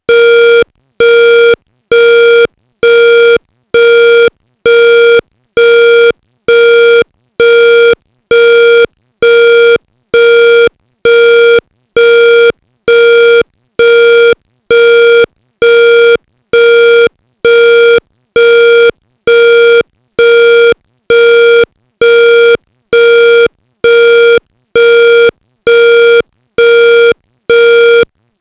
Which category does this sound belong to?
Sound effects > Electronic / Design